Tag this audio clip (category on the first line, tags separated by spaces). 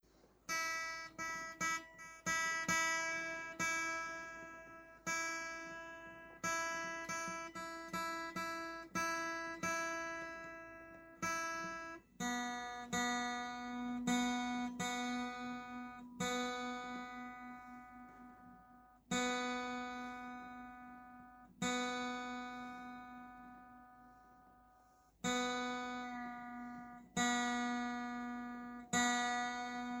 Music > Solo instrument
song guitar music tuning Phone-recording play playing acoustic